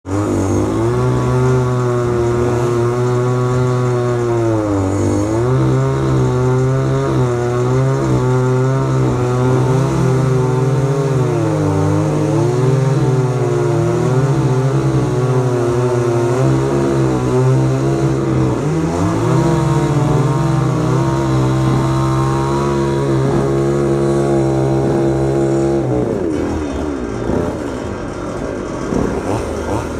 Soundscapes > Other
Vài Cưa Máy - Chain Saw
Chain saws and workers. Record use iPhone 7 Plus smart phone 2025.08.04 15:24
wood, cut, chain-saw, saw